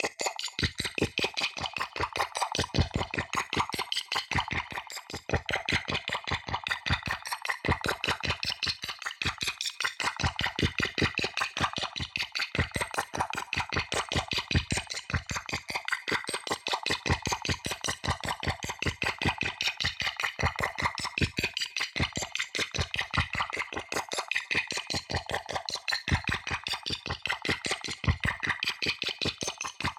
Sound effects > Electronic / Design
Perc Loop-Interesting Granular Percussions Loop 3
All samples used from phaseplant factory. Processed with Khs Filter Table, Khs convolver, ZL EQ, Fruity Limiter. (Celebrate with me! I bought Khs Filter Table and Khs convolver finally!)
Percussion, Ambient, Grain, Abstract, Botanical, Loop